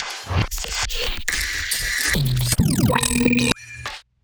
Sound effects > Experimental
Glitch Percs 97
otherworldy, snap, fx, abstract, glitch, crack, whizz, clap, percussion, impact, idm, impacts, perc, pop, experimental, laser, lazer, alien, hiphop, glitchy, zap, sfx, edm